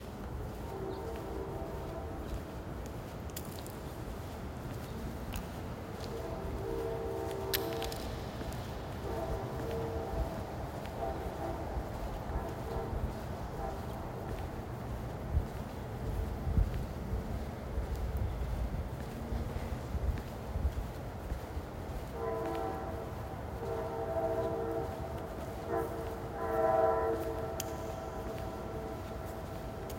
Sound effects > Vehicles
pedestrian walking and train horn
Sounds of a pedestrian walking on a leaf-covered sidewalk. In the distance are the sounds of a train as it rushes away and sounds its horn. Recorded with an iPhone and polished with BandLab.
traffic, soundeffect, pedestrian, trains, horn, footsteps, walk, vehicles, field-recording, vehicle, footstep, train, horns, soundeffects, walking